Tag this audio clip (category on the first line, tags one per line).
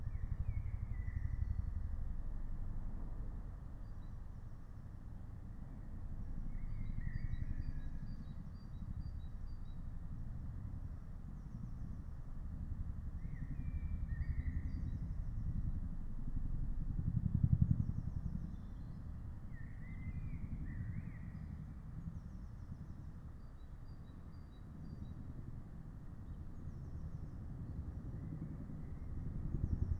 Soundscapes > Nature
meadow
natural-soundscape
nature
phenological-recording
soundscape